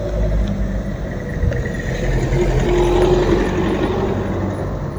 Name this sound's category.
Sound effects > Vehicles